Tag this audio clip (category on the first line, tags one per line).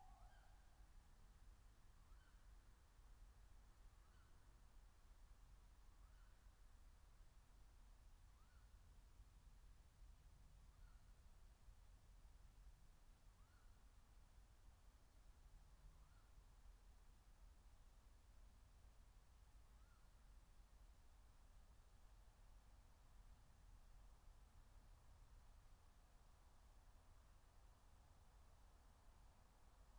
Soundscapes > Nature
soundscape
natural-soundscape
raspberry-pi
field-recording
meadow
nature
alice-holt-forest
phenological-recording